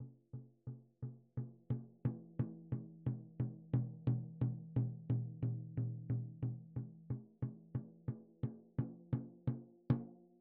Music > Solo percussion
med low tom-tension hit sequence 3 12 inch Sonor Force 3007 Maple Rack
Sample from a studio recording at Calpoly Humboldt in the pro soundproofed studio of a medium tom from a Sonor 3007 maple rack drum, recorded with 1 sm57 and an sm58 beta microphones into logic and processed lightly with Reaper
med-tom, perc, quality